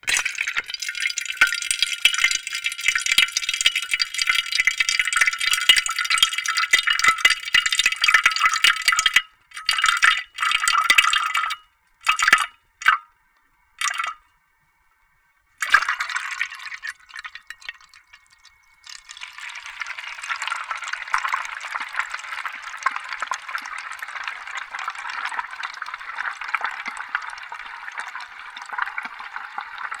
Sound effects > Experimental
Water quickly filling a giant metal thermos recorded with a contact microphone.